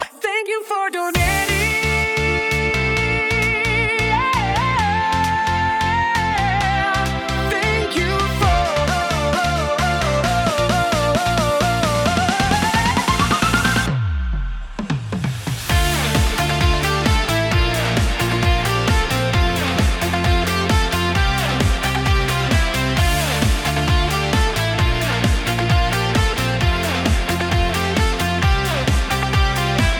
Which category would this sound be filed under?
Music > Multiple instruments